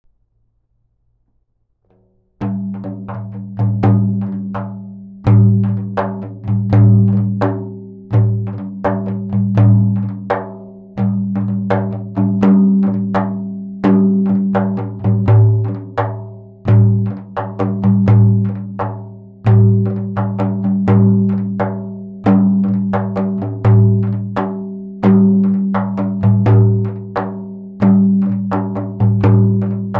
Music > Solo percussion
Solo-percussion of frame drum by Schlagwerk recorded on Pixel 6 Pro